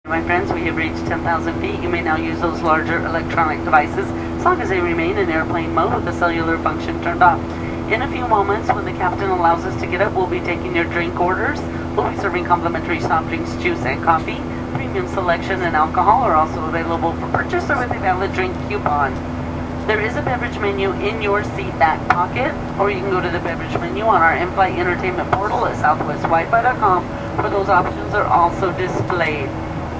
Sound effects > Vehicles
During a Southwest commercial airline flight, a flight attendant makes an announcement upon reaching 10,000ft altitude. "You may now use electronic devices..." etc. Drone of jet engines can also be heard.